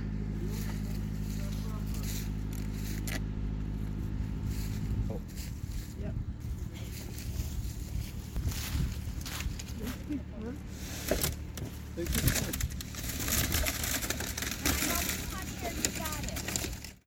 Sound effects > Objects / House appliances
FOLYMisc-Samsung Galaxy Smartphone, CU Tree Wrapper Nicholas Judy TDC
A tree wrapper.